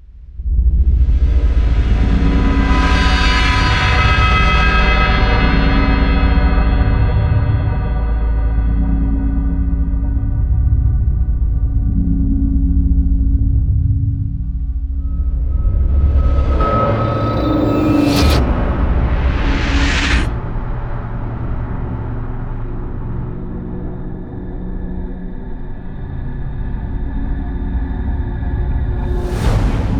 Sound effects > Experimental
alien atmosphere 1b
alien, ambience, astrophysics, aura, bass, bizarre, chilling, crash, cryptic, cymbal, eerie, electronic, exoalien, exoaliens, exoplanet, exoplanets, frightening, ghostly, haunting, ominous, resonance, reverse, spectral, strange, surreal, UFO, uncanny, unearthly, unsettling, weird